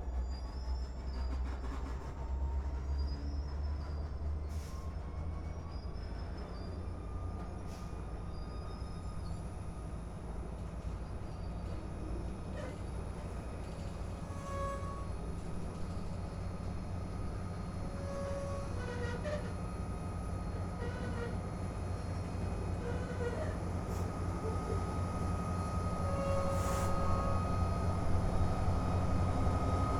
Sound effects > Vehicles
Slow Locomotive Passing
A locomotive passes slowly from right to left, slowing down to an idle and applying brakes.